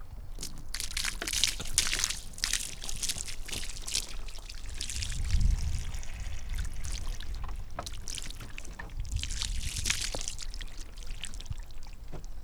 Sound effects > Vehicles
Ford 115 T350 - Pouring water on windscreen
Tascam, 115, France, T350, sloashing, splat, Mono, SM57, fake-blood, Ford, Vehicle